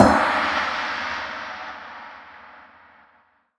Instrument samples > Percussion
cheapgong fake 1c
I have many alternative versions in my crash folder. A blendfile of low-pitched crashes, a gong and a ride with the intent to be used as an audible crash in rock/metal/jazz music. Version 1 is almost unusable, except if you build sounds. tags: crash China gong fake artificial synthetic unnatural contrived metal metallic brass bronze cymbals sinocymbal Sinocymbal crashgong gongcrash fakery drum drums Sabian Soultone Stagg Zildjian Zultan low-pitched Meinl smash metallic Istanbul